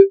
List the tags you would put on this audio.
Instrument samples > Synths / Electronic
bass
fm-synthesis
additive-synthesis

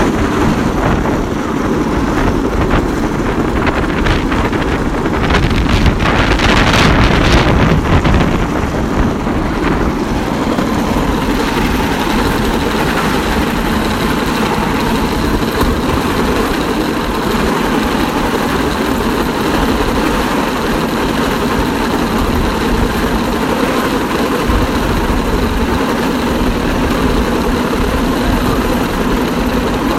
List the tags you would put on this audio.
Soundscapes > Other
ambient
bamboo
Battambang
Cambodia
clack
ride
tracks
train
transport
travel

